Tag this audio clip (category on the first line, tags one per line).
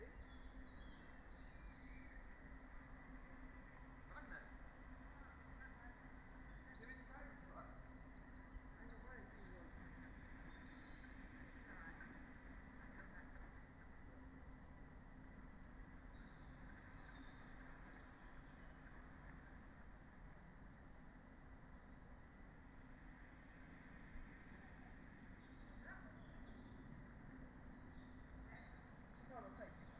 Soundscapes > Nature
weather-data
phenological-recording
nature
field-recording
data-to-sound
alice-holt-forest
sound-installation
modified-soundscape
Dendrophone